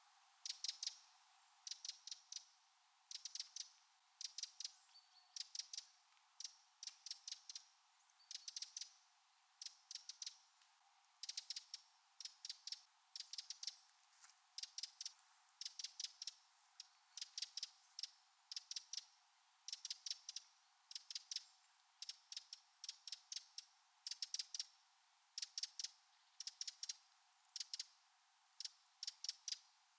Sound effects > Animals
birds eurasian wren alarm close3
Eurasian wren bird singing
nature, birds, wren, birdsong